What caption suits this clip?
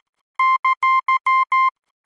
Sound effects > Electronic / Design
Morse SignoExclamacion
A series of beeps that denote the exclamation mark in Morse code. Created using computerized beeps, a short and long one, in Adobe Audition for the purposes of free use.